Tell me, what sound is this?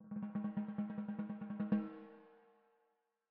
Music > Solo percussion
snare Processed - soft quick paradiddle - 14 by 6.5 inch Brass Ludwig
sfx, processed, snaredrum, flam, rimshot, perc, reverb, fx, kit, snareroll, acoustic, rim, roll, drumkit, crack, brass, ludwig, rimshots, realdrum, hits, percussion, hit, beat, drums, snares, drum, oneshot, realdrums, snare